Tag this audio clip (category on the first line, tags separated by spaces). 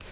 Sound effects > Objects / House appliances
bucket carry clang clatter cleaning container debris drop fill foley garden handle hollow household kitchen knock lid liquid metal object pail plastic pour scoop shake slam spill tip tool water